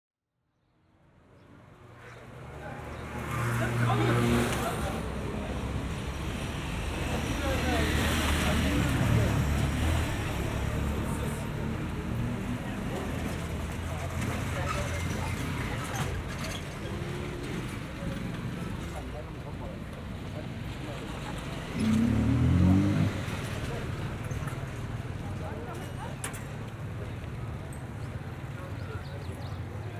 Soundscapes > Urban
A binaural walk around the Marrakech Medina. This is the central walled part of the city that features many 'souks' - winding, covered market streets. The various souks tend to feature different themes: blacksmiths, tanneries etc. Cars are excluded from most of the medina - this is mainly due to the narrowness of the streets - but this is compensated for by the constant danger of being knocked down by a motorbike or moped. The recording was made using a DIY set of in-ear binaural microphones based on Primo EM258 capsules into a Sony A10.